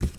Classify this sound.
Sound effects > Objects / House appliances